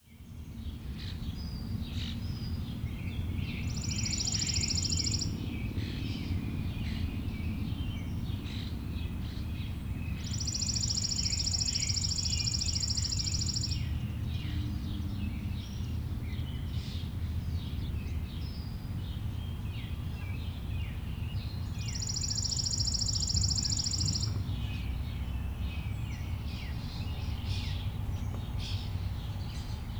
Soundscapes > Nature
Chipping Sparrow breezy Sunday 5 18 2025 MKH8070
The pleasant trilling of the pretty Chipping Sparrow ( Spizella-passerina) recorded on Sunday May 18, 2025 in a small town in Illinois. I've always enjoyed hearing the trill of this pretty bird. Since they are migratory, they are not around in Illinois during winter, so it is very good to be hearing them once again. I've always thought the gentle trilling of the Chipping Sparrows sounds a bit like a high-pitched sewing machine. Equipment- Sound Devices MixPre 3 ii Microphone- Sennheiser MKH 8070